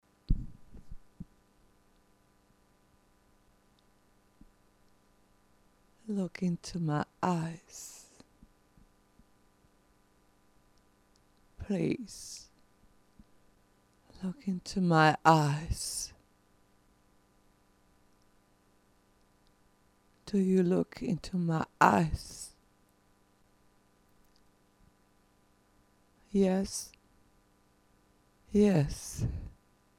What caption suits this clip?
Sound effects > Human sounds and actions
ONLY VOICE-Everything will be okay - second recording
for totally free.
american, english, female, girl, help, prompt, speak, talk, vocal, woman